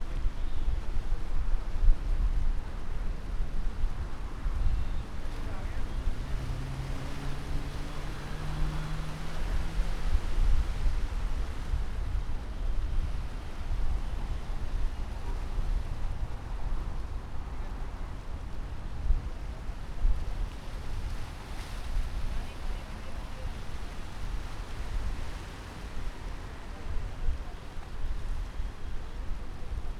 Soundscapes > Urban
Mumbai city - waves and traffic
Recorded this one afternoon in Mumbai, India as the waves crashed the stones on one side, and traffic continued with its rhythm on the other side. I moved my zoom h1n recorder 360 degrees to get in the soundscape of the city surrounded by sea.
ambience, city, field-recording, india, mumbai, people, sea, soundscape, traffic, waterbody